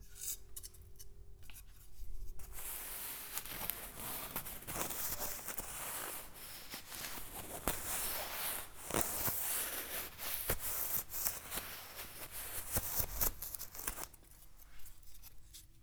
Sound effects > Other mechanisms, engines, machines
Woodshop Foley-023
bam, bang, boom, bop, crackle, foley, fx, knock, little, metal, oneshot, perc, percussion, pop, rustle, sfx, shop, sound, strike, thud, tink, tools, wood